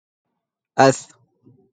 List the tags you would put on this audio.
Sound effects > Other
arabic male voice

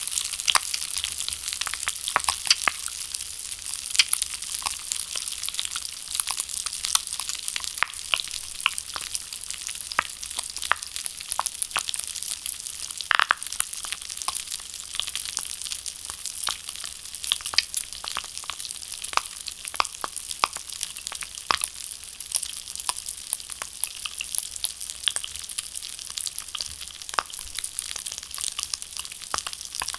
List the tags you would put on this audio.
Sound effects > Objects / House appliances
icecube roaster micro sizzling portacapture close marshmallow frozen pop melting melt kitchen crackling crack ice cube water noise contact x6 crackle popping sizzle cup glass mic metal tascam morsel

